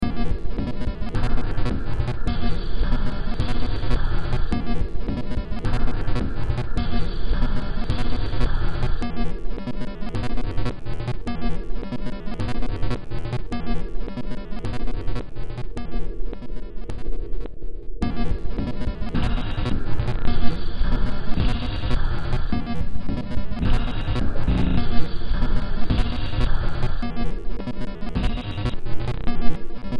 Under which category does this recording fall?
Music > Multiple instruments